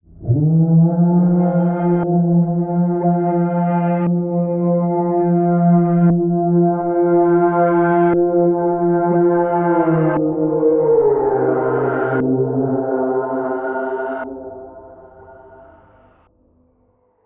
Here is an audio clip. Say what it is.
Speech > Processed / Synthetic
wrecked vox 14
A heavily processed vocal effect recorded using an SM Beta 57a microphone into Reaper, Processed with a myriad of vst effects including Shaperbox, Infiltrator, Fab Filter, etc
abstract, alien, animal, atmosphere, dark, effect, fx, glitch, glitchy, growl, howl, monster, otherworldly, pitch, processed, reverb, sfx, shout, sound-design, sounddesign, spooky, strange, vocal, vocals, vox, weird, wtf